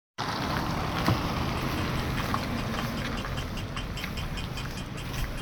Soundscapes > Urban
car sound 1
Car with studded tires recorded on phone
Cars, Passing, tires, Stutted